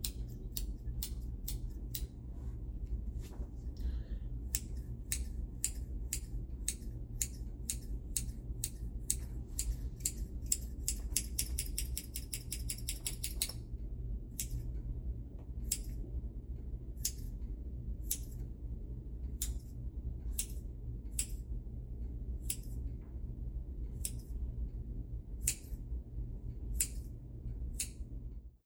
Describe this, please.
Sound effects > Objects / House appliances
OBJOffc-Samsung Galaxy Smartphone, MCU Tiny Scissors, Snipping Nicholas Judy TDC
Tiny scissor snips.